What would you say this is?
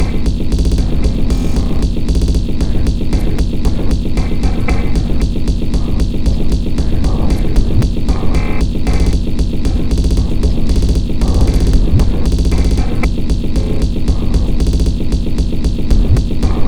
Instrument samples > Percussion
This 115bpm Drum Loop is good for composing Industrial/Electronic/Ambient songs or using as soundtrack to a sci-fi/suspense/horror indie game or short film.
Alien, Ambient, Dark, Industrial, Loopable, Packs, Soundtrack, Weird